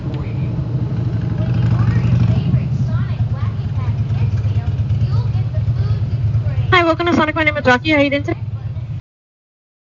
Sound effects > Human sounds and actions
SONIC DRIVE IN
sonic intercom welcome.
drivein, intercom